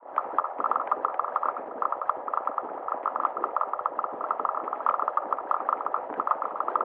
Sound effects > Objects / House appliances
Boiling Water5
Water, Boiling, Bubble